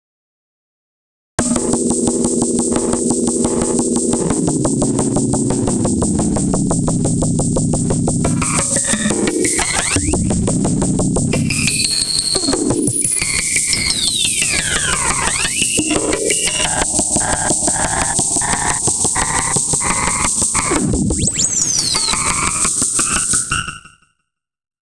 Music > Solo percussion
Simple Bass Drum and Snare Pattern with Weirdness Added 053
Glitchy, Noisy, Bass-Drum, Interesting-Results, Experimental, Four-Over-Four-Pattern, Experiments-on-Drum-Patterns, FX-Drums, Bass-and-Snare, FX-Drum, FX-Drum-Pattern, FX-Laden, Fun, Experiments-on-Drum-Beats, FX-Laden-Simple-Drum-Pattern, Simple-Drum-Pattern, Snare-Drum, Silly, Experimental-Production